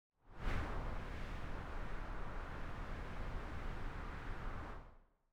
Sound effects > Electronic / Design
This is a sound I created in vital to mimic the displacement of air of a rock flaling from above.
Wind, Whoosh